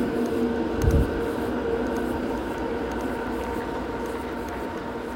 Soundscapes > Urban
Audio of tram passing by. Location is Tampere, Hervanta. Recorded in winter 2025. No snow, wet roads, not windy. Recorded with iPhone 13 mini, using in-built voice memo app.
tampere, tram, vehicle